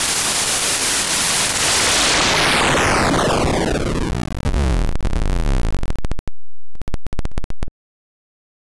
Sound effects > Electronic / Design
crappy noise sweep
made in openmpt. looks cool but sounds... meh... idk, ya could find a use
bad, crappy, electronic, etheraud, idk, noise, sweep, weird